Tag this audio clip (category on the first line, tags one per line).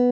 Instrument samples > String
stratocaster
cheap
arpeggio
design
tone
sound
guitar